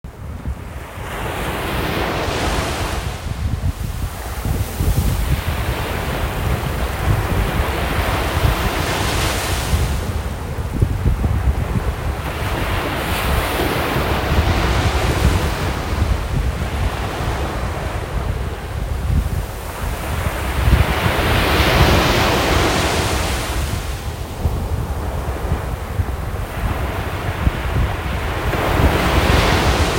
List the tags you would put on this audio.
Sound effects > Natural elements and explosions
waves surf coast seaside ocean shore sea beach

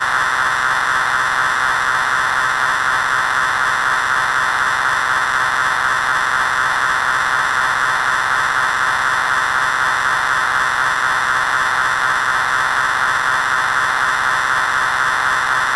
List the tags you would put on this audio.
Sound effects > Other mechanisms, engines, machines
Machinery Noise Industry IDM Synthetic Working

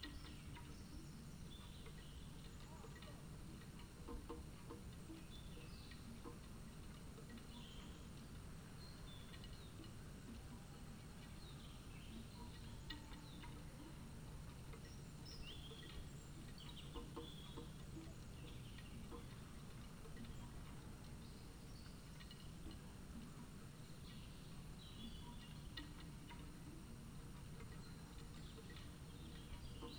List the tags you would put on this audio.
Soundscapes > Nature
data-to-sound field-recording modified-soundscape nature phenological-recording weather-data

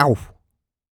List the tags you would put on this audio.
Speech > Solo speech
2025 Adult arf Calm FR-AV2 Generic-lines hurt Hypercardioid july Male mid-20s MKE-600 MKE600 pain Sennheiser Shotgun-mic Shotgun-microphone Single-mic-mono Tascam VA Voice-acting

